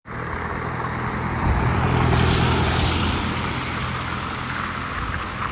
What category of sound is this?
Sound effects > Vehicles